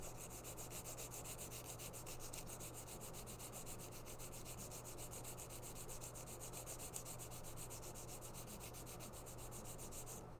Sound effects > Human sounds and actions
Someone filing it's fingernails.